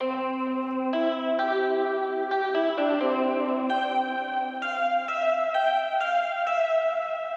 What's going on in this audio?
Instrument samples > Synths / Electronic
emotional kinda guitar pluck 130 BPM
made this myself in fl studio using Twin 3 default preset along with esw vinyl guitar 2 (rainy keetar preset). Used a grand piano for the bass(played the root note in a low octave)
clean, electric, emotional, feel, guitar, pluck, sad, vibes, vinyl